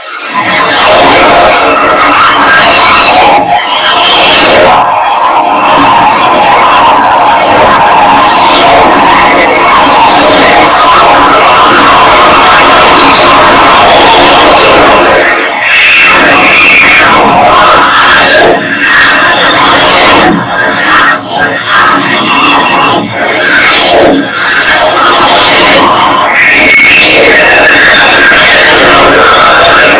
Sound effects > Other
Strange Warping
Made this on accident while playing with paulstretches in audacity